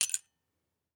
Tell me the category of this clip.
Sound effects > Other mechanisms, engines, machines